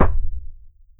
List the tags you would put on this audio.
Sound effects > Human sounds and actions
carpet footstep footsteps lofi running steps synth walk walking

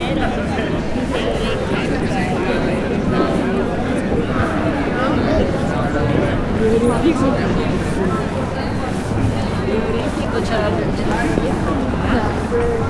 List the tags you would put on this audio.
Soundscapes > Urban
chat portico porch chatting sunday italy field-recording talking arcade people crowd italian chatter voices

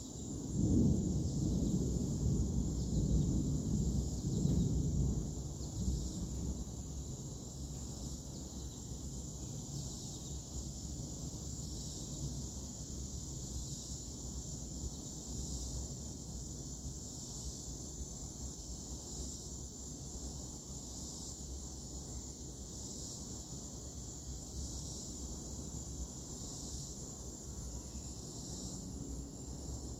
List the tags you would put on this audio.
Soundscapes > Nature
thunderstorm birds

